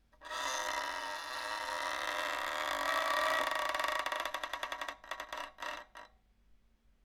Instrument samples > String
Bowing broken violin string 3
uncomfortable, creepy, beatup, strings, horror, bow, violin